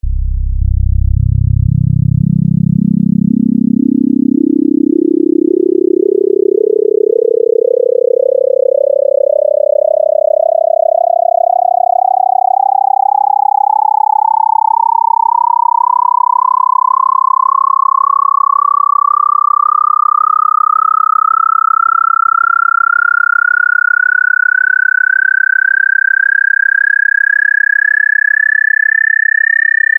Instrument samples > Synths / Electronic
06. FM-X RES1 SKIRT3 RES0-99 bpm110change C0root
FM-X, MODX, Montage, Yamaha